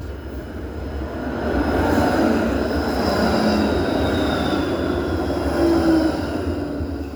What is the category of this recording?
Sound effects > Vehicles